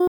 Sound effects > Electronic / Design
Videogame Talk Beep High
a short beep comparable to dialogue in animal crossing or undertale. i made this for my own project but i didn't like it. i made this in audacity by splicing up a clip of my own once and changing the pitch
rpg, videogame, animalcrossing, undertale